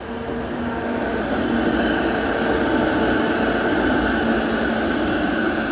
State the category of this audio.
Sound effects > Vehicles